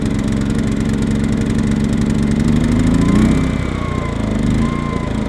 Sound effects > Other mechanisms, engines, machines
Gas Generator idling.